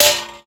Sound effects > Objects / House appliances
shot-Bafflebanging-8
banging, impact, metal